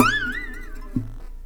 Music > Solo instrument
acoustic guitar slide13
string, knock, dissonant, solo, chord, acosutic, pretty, instrument, guitar, riff, twang, chords, slap, strings